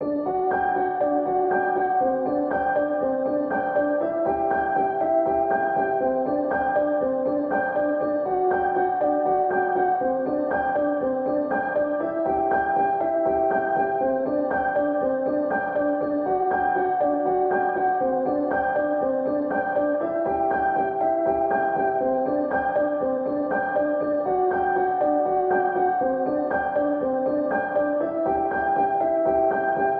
Music > Solo instrument
Piano loops 107 efect 4 octave long loop 120 bpm
120, loop, music, piano, simple, simplesamples